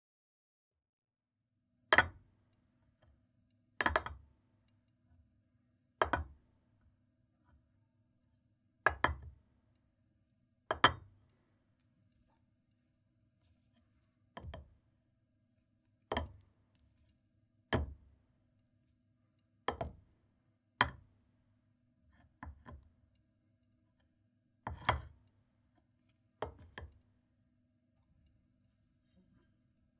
Sound effects > Objects / House appliances
Sound of Ceramic Dishes on Wood
Here is several sounds of putting a ceramic bowl on a wooden plank.